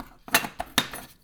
Sound effects > Other mechanisms, engines, machines
metal shop foley -224
bam, sfx, tools